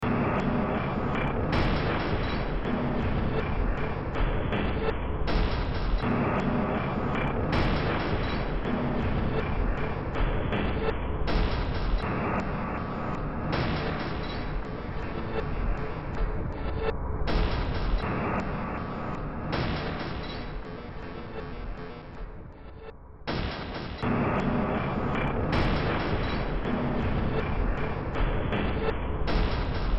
Music > Multiple instruments
Demo Track #3621 (Industraumatic)
Soundtrack, Cyberpunk, Ambient, Noise, Industrial, Horror, Games, Sci-fi, Underground